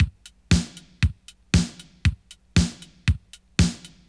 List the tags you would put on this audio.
Music > Solo percussion
117bpm 1lovewav 80s drumloop drums-loop kick-snare

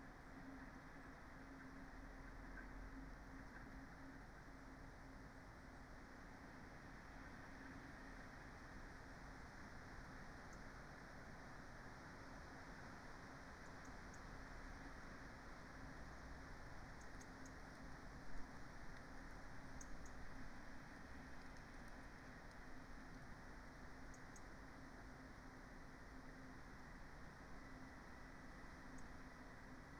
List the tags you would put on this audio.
Soundscapes > Nature
alice-holt-forest Dendrophone modified-soundscape raspberry-pi

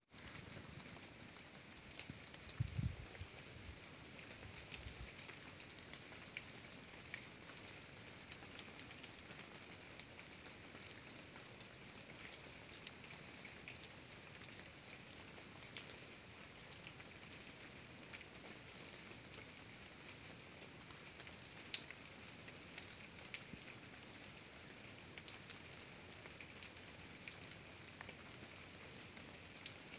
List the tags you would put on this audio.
Soundscapes > Nature
Drops Rain

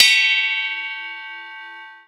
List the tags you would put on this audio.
Instrument samples > Percussion
bell cymbal ding metal metallic one-hit ping ring ting xbell zbell

